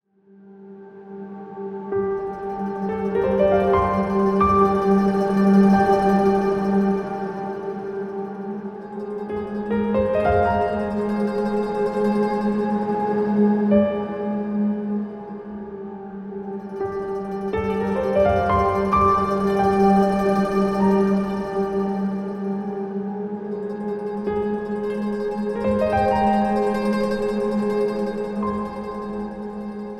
Music > Multiple instruments
Whisper of the Wind (Loud)
It makes me think of intense nostalgia or peaceful meditation. But what do YOU hear?
contemplative-music, contemplative-piano, cotemplative-piano-chords, deep-meditaion, gentle-piano-arpeggios, gentle-piano-chords, meditation, meditation-music, meditative-ambience, meditative-jingle, meditative-music, meditative-music-loop, musical-nostalgia, nostalgic-ambience, nostalgic-piano, nostalgic-piano-arpeggios, nostalgic-piano-chords, nostalgic-vibes, nostlagic-music, peaceful-meditation, peaceful-piano-arpeggios, peaceful-piano-chords, piano-arpeggesio, reflective-piano, reflective-piano-chords, sacred-meditation, soothing-piano, soothing-piano-chords